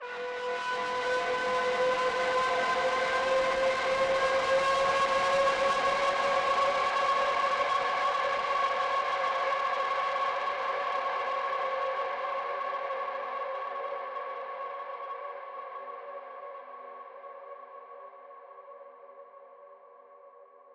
Sound effects > Electronic / Design
WARNING TWO
The second of two haunting drones created with a synth and processed with various plugins. Use this one to add "haunt" to your piece. It comes with a bit more grit than WARNING ONE.